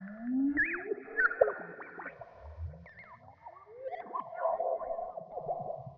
Soundscapes > Synthetic / Artificial
LFO Birsdsong 82
Birsdsong, massive